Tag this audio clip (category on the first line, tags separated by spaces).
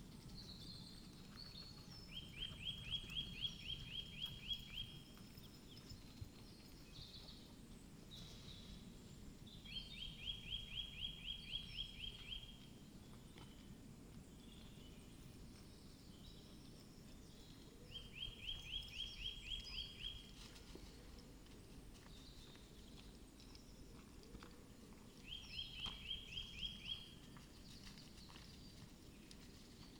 Soundscapes > Nature
weather-data field-recording data-to-sound natural-soundscape phenological-recording Dendrophone modified-soundscape nature artistic-intervention raspberry-pi soundscape sound-installation alice-holt-forest